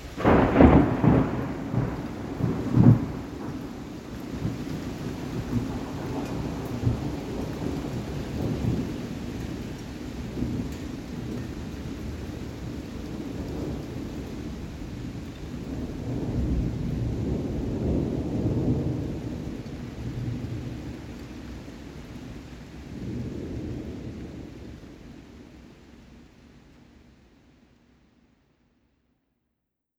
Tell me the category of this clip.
Sound effects > Natural elements and explosions